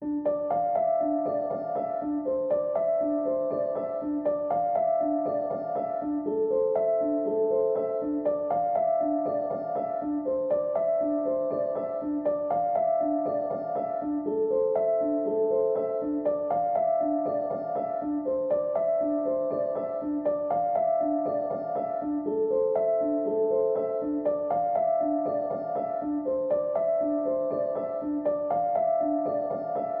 Music > Solo instrument
Piano loops 183 octave up long loop 120 bpm
120, 120bpm, free, loop, music, piano, pianomusic, reverb, samples, simple, simplesamples